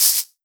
Sound effects > Objects / House appliances
Pencil on rough paper or parchment, or scratching on a rough, sandy surface. Foley emulation using wavetable synthesis.